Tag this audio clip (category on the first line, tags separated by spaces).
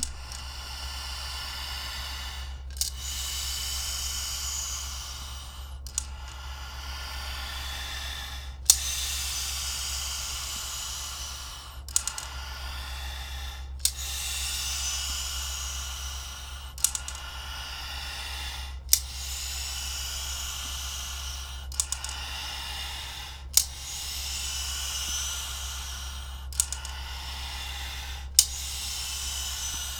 Sound effects > Human sounds and actions
breath inhale exhale Blue-Snowball breathing-machine human voldyne Blue-brand